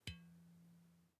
Sound effects > Other mechanisms, engines, machines
Small Boing 02
sample, boing, noise, garage